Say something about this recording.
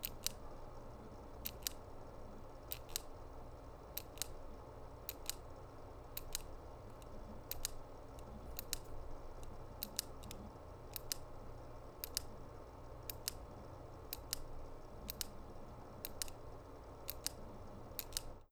Sound effects > Other mechanisms, engines, machines
MECHClik-Blue Snowball Microphone Plastic, Clicking, Flashlight Nicholas Judy TDC
Plastic clicking like a flashlight.